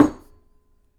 Sound effects > Other mechanisms, engines, machines
metal shop foley -046
little, pop, strike, tink, sound, metal, wood, oneshot, crackle, shop, foley, bam, tools, fx, rustle, percussion, bang, boom, knock, perc, thud, bop, sfx